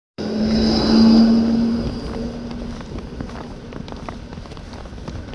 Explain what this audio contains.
Soundscapes > Urban
Tampere tram recording